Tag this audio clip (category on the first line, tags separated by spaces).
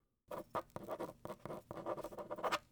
Sound effects > Human sounds and actions
write writing